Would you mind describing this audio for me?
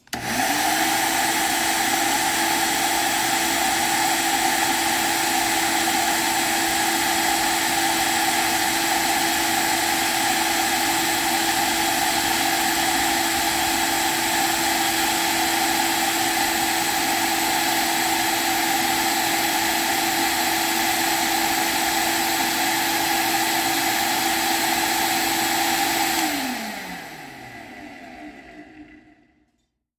Sound effects > Other mechanisms, engines, machines
MACHPump-Samsung Galaxy Smartphone, CU Intex Quick Fill, Start, Run, Stop Nicholas Judy TDC
An Intex Quick Fill pump starting, running and stopping.
intex-quick-fill, Phone-recording, pump, run, start, stop